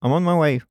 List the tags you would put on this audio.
Speech > Solo speech
MKE-600
Single-mic-mono
mid-20s
Tascam
moving
movement
Male
2025
MKE600
Sennheiser
july
Shotgun-microphone
Shotgun-mic
Calm
VA
Voice-acting
FR-AV2
Adult
Generic-lines

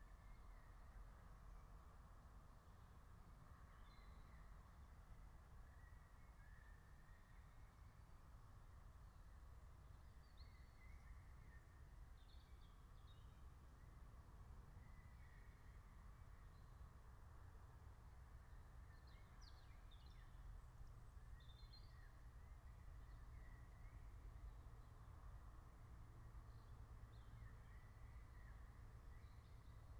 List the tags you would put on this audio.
Soundscapes > Nature
nature
natural-soundscape
meadow
raspberry-pi
alice-holt-forest

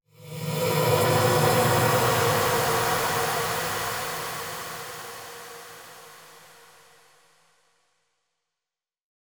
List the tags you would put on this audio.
Sound effects > Electronic / Design
dark
reverse
shimmering
sweeping

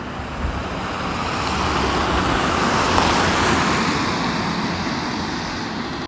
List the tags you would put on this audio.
Sound effects > Vehicles
hervanta
road
outdoor

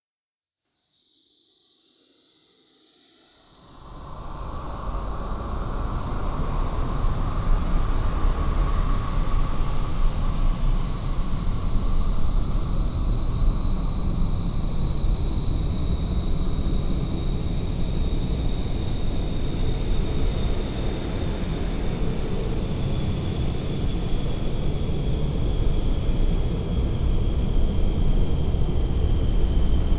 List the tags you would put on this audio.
Sound effects > Experimental
arabic
beach
clock
coast
desert
experimental
fantasy
hourglass
magic
magical
oasis
pendulum
persian
princeofpersia
reverse
sand
sands
sea
shore
sorcery
tick-tock
tictac
time
waves
witch

